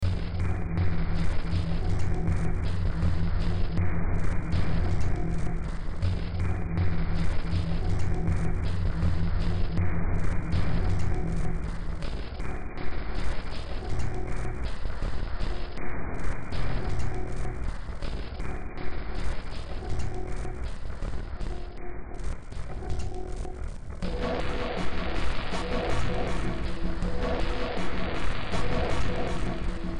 Music > Multiple instruments
Demo Track #3138 (Industraumatic)
Ambient, Noise, Sci-fi